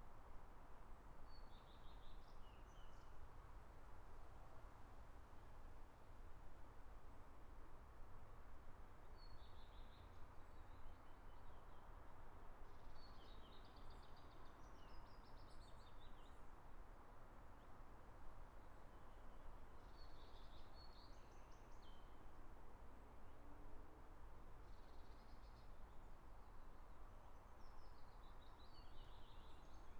Soundscapes > Nature
AMBForst Birds and distant highway sounds from a field at the Ekebacken nature reserve, Lyckeby, Sweden
Recorded 14:27 02/06/25 Afternoon in this leafy nature reserve with a light breeze. It’s in between urban areas so there’s a constant sound from traffic far away. However in the field there’s trees rustling, and birds such as warblers, redstarts, jackdaws, blue tits and at the end a blackbird. Zoom H5 recorder, track length cut otherwise unedited.
Forest, Birds, Field, Blue, Wind, Redstart, Karlskrona, Sweden, Blackbird, Jackdaw, Traffic, Crow, Highway, Reserve, Afternoon, Distant, Field-Recording, Warbler, Lyckeby, Tit, Light